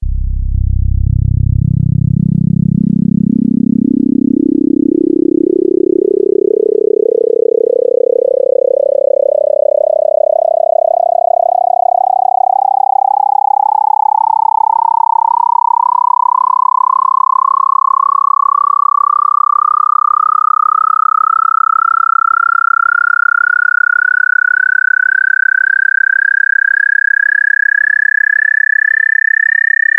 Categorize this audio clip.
Instrument samples > Synths / Electronic